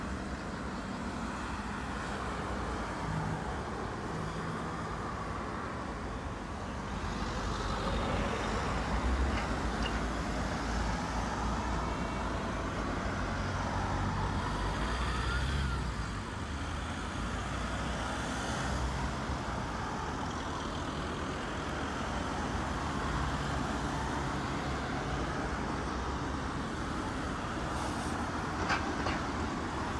Soundscapes > Urban
ROUNDABOUT ALMASSORA SPAIN
This audio contains sounds that were recorded at a roundabout containing different kinds of vehicles in a small town in Spain called Almassora.
ROAD, AFTERNOON, MOTOCYCLES, TRAFFIC, CAR